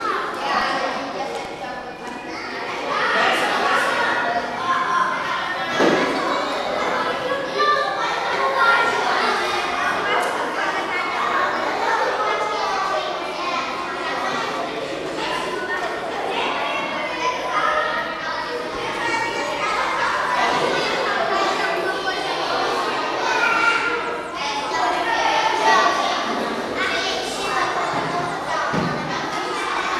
Soundscapes > Indoors
3 - Crianças conversando nos corredores da escola - Kids talking on school corridors (brazilian portuguese)
Áudio gravado no Colégio Objetivo de Botafogo, na cidade do Rio de Janeiro (Rua Álvaro Ramos, n° 441) no dia 6 de outubro de 2022. Gravação originalmente feita para o documentário "Amaro: O Colégio da Memória", sobre o vizinho Colégio Santo Amaro, que fechou durante a pandemia. Vozerio de crianças conversando, indo para o recreio, jogando futebol, brincando, relativamente próximas do microfone, bastante eco. Vozes de adultos são ouvidas ocasionalmente. Foi utilizado o gravador Zoom H1N. Ao final do áudio, digo que as crianças estavam lanchando, no andar de baixo da escola. // Audio recorded at the Objetivo School in the Botafogo neighborhood, in Rio de Janeiro, on the october 6th, 2022. Recording originally made for the brazilian documentary feature film "Amaro: The School in Our Memory", which tells the story of the Santo Amaro School, also located in Botafogo, but closed during the pandemic.